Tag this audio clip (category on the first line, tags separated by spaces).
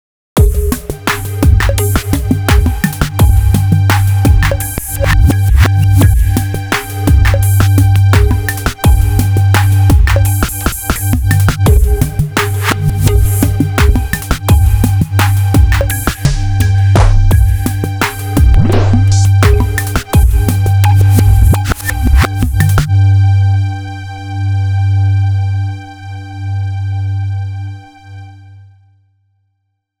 Music > Multiple instruments
melody percussion industrial hop new drumloop wave melodies loops patterns beats glitchy edm bass idm hip